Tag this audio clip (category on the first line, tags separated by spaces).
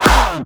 Sound effects > Human sounds and actions
punch slap hit kick slaps